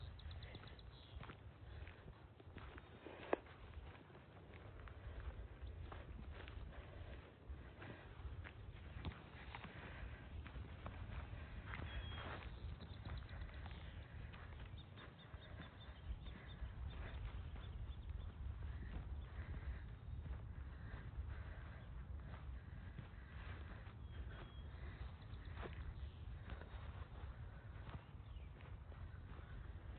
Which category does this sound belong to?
Soundscapes > Nature